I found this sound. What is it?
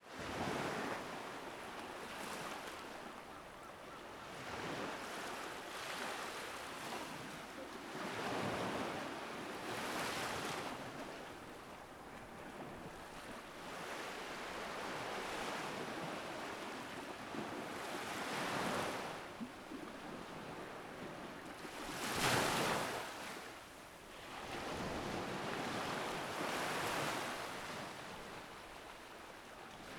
Soundscapes > Nature
Sea. Surf. Waves
Recorded that sound by myself with Recorder H1 Essential
Waves, Surf, Sea